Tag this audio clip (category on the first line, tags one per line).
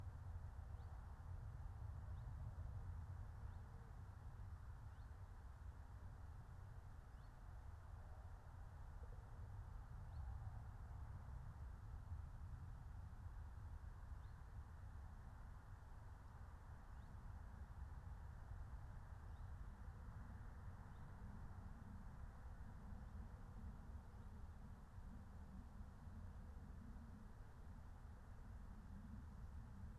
Soundscapes > Nature
meadow
raspberry-pi
alice-holt-forest
soundscape
natural-soundscape
field-recording
nature
phenological-recording